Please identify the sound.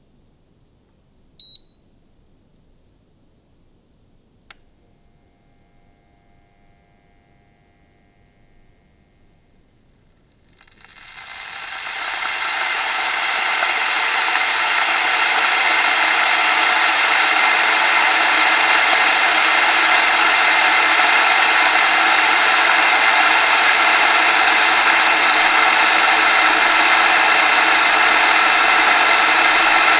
Sound effects > Objects / House appliances
kettle-water-boiling
The sound of water heating and bubbling inside an electric kettle. Recorded with Zoom H6 and SGH-6 Shotgun mic capsule.
boiling, bubbling, kettle, kitchen, water